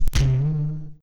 Sound effects > Electronic / Design
Stun Effect
Mood, Reverb, Impulse, Spring, Dark